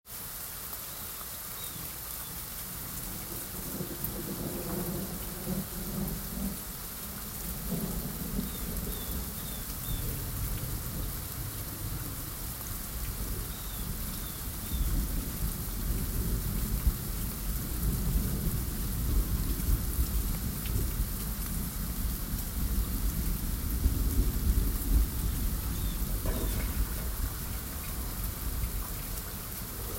Soundscapes > Nature
Medium Rain - July 2025
Recorded with an iPhone XR.
nature, thunderstorm, rain, field-recording, weather, storm, wind, thunder